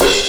Instrument samples > Percussion
crash Zildjian 16 inches bassized brief 2
Stagg, multi-China, multicrash, Zultan, metallic, polycrash, metal, crash, cymbal, sinocymbal, crack, Meinl, clash, Istanbul, clang, Paiste, bang, shimmer, Sabian, sinocrash, smash, China, spock, Zildjian, Avedis, Soultone, crunch, low-pitched